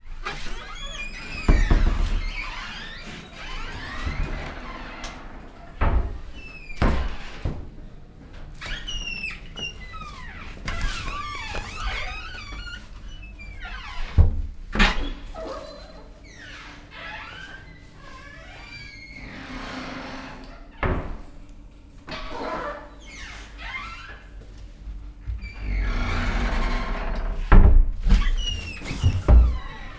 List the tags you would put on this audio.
Sound effects > Objects / House appliances
Toilet
Open
Creak
Old
Squeak
Closing
Door

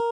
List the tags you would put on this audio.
Instrument samples > String
design
cheap
sound
tone
guitar
stratocaster
arpeggio

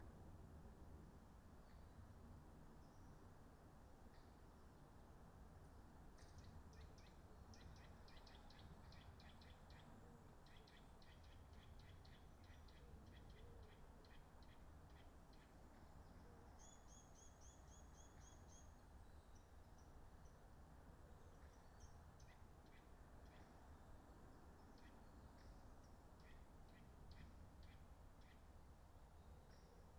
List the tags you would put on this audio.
Soundscapes > Nature
data-to-sound
sound-installation
field-recording
nature
modified-soundscape
weather-data
natural-soundscape
Dendrophone
alice-holt-forest
raspberry-pi
artistic-intervention
soundscape
phenological-recording

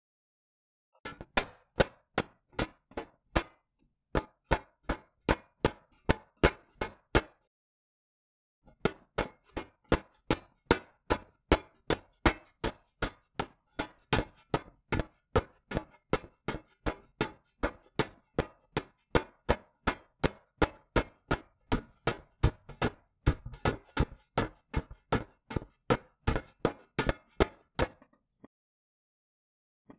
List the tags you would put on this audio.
Sound effects > Objects / House appliances
droid
vexbug
starwars
science
spider
bot
sci-fi
leg
walk
bionic
automation
computer
android
science-fiction
robotic
machine
ladder
metal
multi-legged
steps
robot
mechanical
small
bug
footstep
fiction
cyborg

